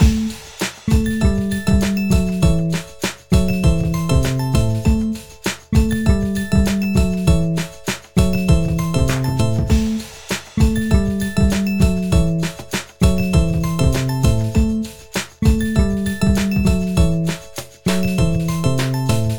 Music > Multiple instruments
something me and my friends made a couple years back. we thought it sounded like music for a character selection section of a video game
artificial, bass, character, computer, electronic, game, happy, loop, loops, music, piano, selectionn, synth, synthesizer, video